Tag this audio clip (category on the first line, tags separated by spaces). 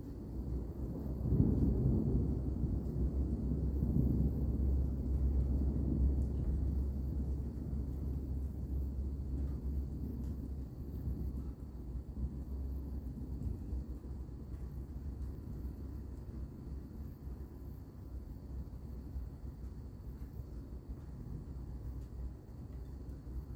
Sound effects > Natural elements and explosions
background thunder ambience overlay distant crickets rumble Phone-recording